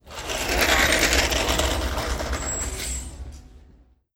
Sound effects > Objects / House appliances
TOYMech-Samsung Galaxy Smartphone, CU Car, Pass By Nicholas Judy TDC
A toy car passing by. Recorded at Goodwill.
Phone-recording, toy, pass-by, car